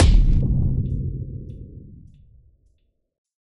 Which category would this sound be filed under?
Sound effects > Electronic / Design